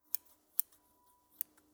Sound effects > Objects / House appliances
Scissor Foley Snips and Cuts 5
cut, foley, fx, household, metal, perc, scissor, scissors, scrape, sfx, slice, snip, tools